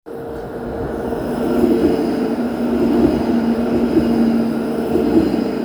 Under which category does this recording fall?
Soundscapes > Urban